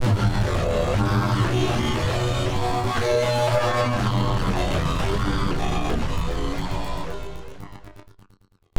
Sound effects > Electronic / Design
Optical Theremin 6 Osc ball delay-006
Alien, Analog, Bass, Digital, DIY, Dub, Electro, Electronic, Experimental, FX, Glitch, Glitchy, Handmadeelectronic, Infiltrator, Instrument, Noise, noisey, Optical, Otherworldly, Robot, Robotic, Sci-fi, Scifi, SFX, Spacey, Sweep, Synth, Theremin, Theremins, Trippy